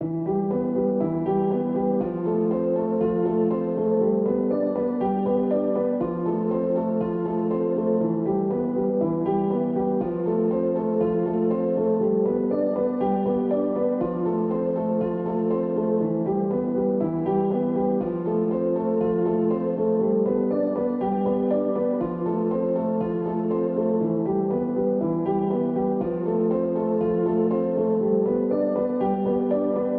Music > Solo instrument
Piano loops 060 efect 4 octave long loop 120 bpm
120, 120bpm, free, loop, music, piano, pianomusic, reverb, samples, simple, simplesamples